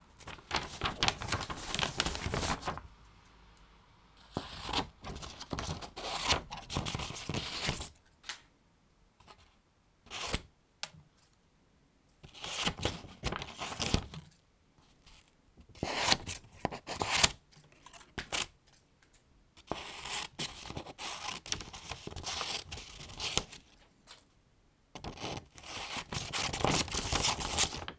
Sound effects > Objects / House appliances
Paper Scissors
Cutting a piece of paper with scissors and dropping the pieces on a laminate floor. Recorded via Zoom H2n, mid/side mode, in a small room.